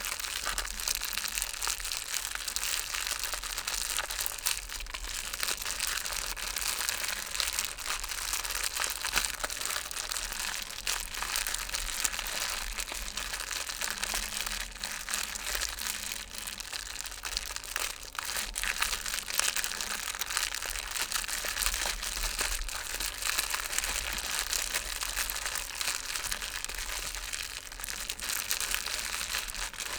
Sound effects > Natural elements and explosions
FIRECrkl-Blue Snowball Microphone, CU Small, Crackling, Simulated Nicholas Judy TDC
Small fire crackling. Simulated.
Blue-brand, Blue-Snowball, cartoon, crackle, fire, foley, simulated, small